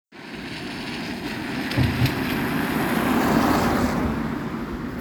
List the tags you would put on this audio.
Sound effects > Vehicles
asphalt-road car moderate-speed passing-by studded-tires wet-road

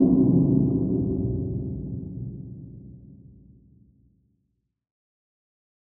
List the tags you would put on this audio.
Sound effects > Electronic / Design
BASSY BOOM DEEP DIFFERENT EXPERIMENTAL EXPLOSION HIPHOP HIT IMPACT INNOVATIVE LOW RAP RATTLING RUMBLING TRAP UNIQUE

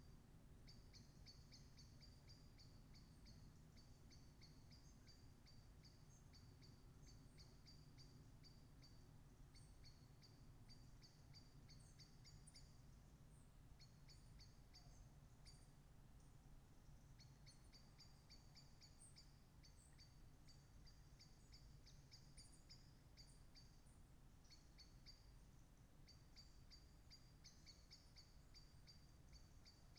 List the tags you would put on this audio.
Soundscapes > Nature
nature Dendrophone raspberry-pi phenological-recording artistic-intervention weather-data sound-installation data-to-sound field-recording modified-soundscape

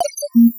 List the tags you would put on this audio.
Sound effects > Electronic / Design
options button Digital menu UI notification alert interface

